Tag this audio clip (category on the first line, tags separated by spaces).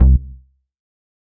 Instrument samples > Synths / Electronic
bass synth vst vsti